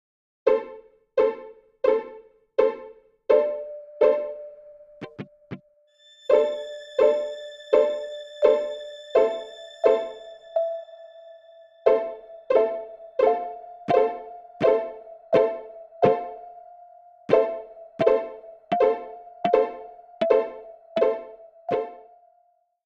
Music > Multiple instruments
A MIDI Mysterious Song made in BandLab. Can be used in everything you want.